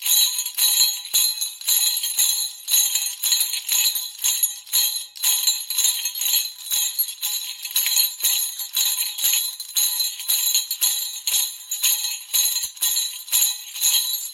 Music > Solo percussion
BELLHand-Samsung Galaxy Smartphone, CU Sleigh Bells Jingling, Slow Nicholas Judy TDC
Slow sleigh bells jingling. Looped.
bells, jingle, Phone-recording, sleigh, slow